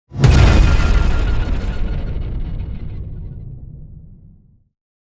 Sound effects > Other
Sound Design Elements Impact SFX PS 038
Effects recorded from the field.
power, shockwave, collision, hard, heavy, sharp, blunt, impact, thudbang, percussive, smash, hit, audio, sound, sfx, strike, cinematic, force, game, effects, rumble, design, explosion, crash, transient